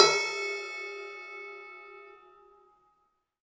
Music > Solo instrument
Cymbal Grab Stop Mute-016
Crash, Custom, Cymbal, Cymbals, Drum, Drums, FX, GONG, Hat, Kit, Metal, Oneshot, Paiste, Perc, Percussion, Ride, Sabian